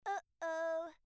Sound effects > Human sounds and actions
little girl Oh oh
It's a fxs created for the game Dungeons and Bubbles for The Global Game Jam 2025.
baby, child, foley, fxs, girl, kid, little, Oh, Ohoh